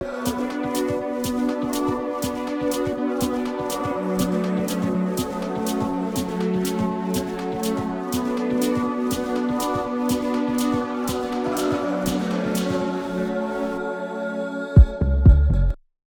Music > Multiple instruments
cool bridge for a beat, inspired in rusowski style of music. Part of a whole beat. AI generated: (Suno v4) with the following prompt: generate a funky and fun instrumental inspired in the spanish artist rusowski or something similar, with vocal chops and a nice bass, in F# minor, at 90 bpm.